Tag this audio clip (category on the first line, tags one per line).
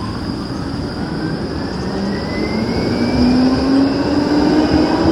Sound effects > Vehicles
city
Tram
urban